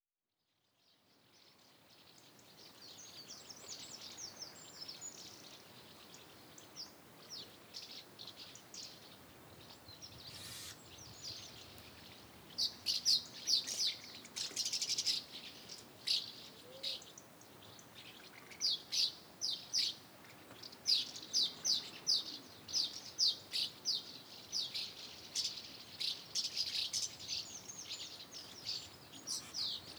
Soundscapes > Nature
House Martins Migratory Behaviour
ORTF set up using Line Audio CM4's. At end of August right through to October, House Martin gather together in large flocks to feed on and off the cliffs below Tantallon Castle in East Lothian, just before they start their journey south to sub-Saharan Africa. Had to cut the low end quite a bit for this as never had sufficient wind protection. There's always next year! Recorded September 6th 2025.
birds, coast, field-recording, house-martin, nature, soundscape